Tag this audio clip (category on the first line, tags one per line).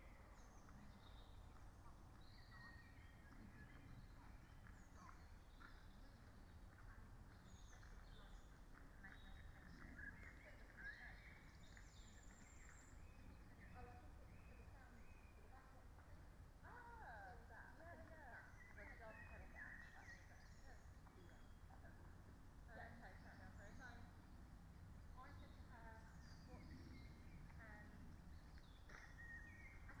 Soundscapes > Nature
artistic-intervention
natural-soundscape
phenological-recording
weather-data
raspberry-pi
modified-soundscape
sound-installation
soundscape
data-to-sound
nature
field-recording
Dendrophone
alice-holt-forest